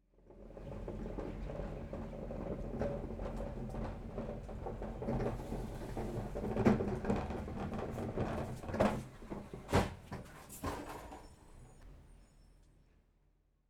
Sound effects > Objects / House appliances
Wheeling a standard domestic plastic wheelie rubbish bin across a pebblecrete driveway from outside to inside a garage. Recorded with a Zoom H4n.
bin, concrete, garbage, pebblecrete, rubbish, sfx, wheel, wheelie, wheeling